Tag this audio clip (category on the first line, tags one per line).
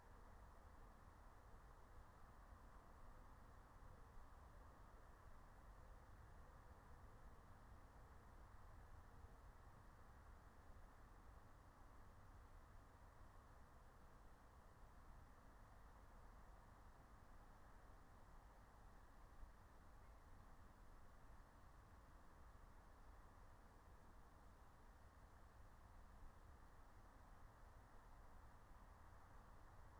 Soundscapes > Nature
alice-holt-forest
natural-soundscape
phenological-recording